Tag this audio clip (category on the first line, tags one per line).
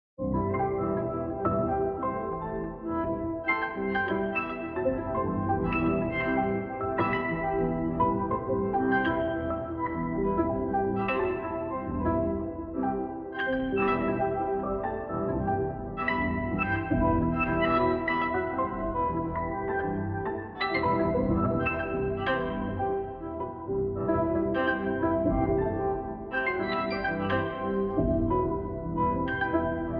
Music > Solo instrument
Piano
Tension
Botanica
Video